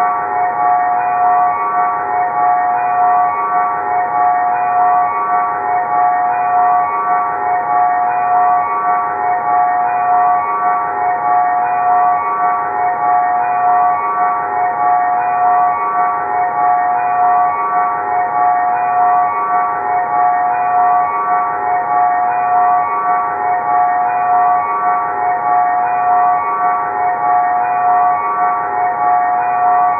Sound effects > Experimental
Pitch-shifted breaking glass loop
"Creepy musical glass" from xkeril, pitch-shifted, reversed and looped using the EHX 22500 dual loop pedal. The resulting drone is a harsh artificial high pitched sound, almost monotonic. Reminds me of the album "Weerkaatsing" by Macinefabriek & Orhpax.